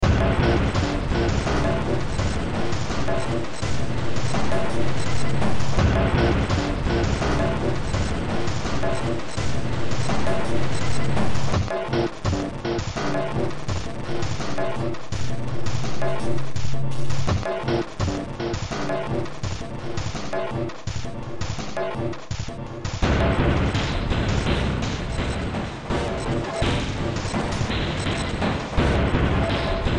Music > Multiple instruments
Demo Track #3308 (Industraumatic)
Horror, Games, Cyberpunk, Sci-fi